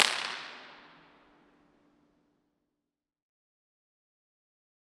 Soundscapes > Urban
This is the best IR I've recorded so far. I found a large underpass for industrial vehicles and albeit being unsure if I was allowed to do weird stuff there, I grabbed my Portacapture X8 and an IR whip.
convolution, Free, Impulse, IR, Large, Response, Reverb, Tunnel, Whip